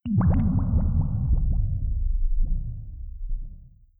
Sound effects > Electronic / Design
A huge underwater bubble sound made with U-he Zebra and processed through various GRM plugins, The reference for the sound is the underwaters Parts from Shadow of the Tomb Raider
Aquatic, Bubbles, Huge, liquid, UnderWater, Water, wet